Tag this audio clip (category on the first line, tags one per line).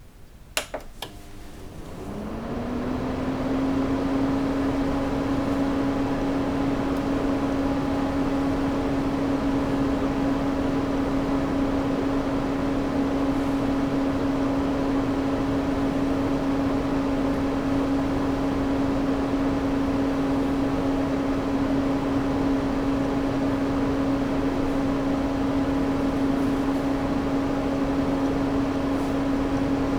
Sound effects > Objects / House appliances
220v
50hz-electrisity
Cardioid
Dehumidifier
ECM-999
ECM999
Finether
FR-AV2
home-appliance
indoors
NT5
OLS12-009-1
Omni
Rode
Superlux
Tascam
ventilation